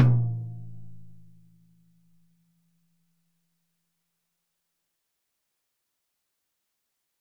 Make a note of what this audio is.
Music > Solo percussion
Med-low Tom - Oneshot 14 12 inch Sonor Force 3007 Maple Rack
drum, drumkit, flam, loop, maple, Medium-Tom, med-tom, perc, percussion, quality, real, roll, toms, wood